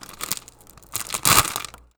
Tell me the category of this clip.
Sound effects > Objects / House appliances